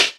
Instrument samples > Percussion
hi-hatized crash 20'' Sabian Vault Artisan v2
It's a bass hi-hat based on a re-enveloped crash file. closed-hi-hatized namesake crash (search my crash folder) I drew the waveform's envelope on WaveLab 11. tags: hi-hat minicymbal picocymbal click metal metallic tick bronze brass cymbal-pedal drum drums percussion hat hat-cymbal closed-hat closed-cymbals chick-cymbals hat-set snappy-hats facing-cymbals dark crisp dark-crisp Zildjian Sabian Meinl Paiste Istanbul Bosporus
facing-cymbals, closed-hat, hat-set, brass, tick, Paiste, cymbal-pedal, dark-crisp, Bosporus, percussion, closed-cymbals, snappy-hats, bronze, hat-cymbal, picocymbal, chick-cymbals, Istanbul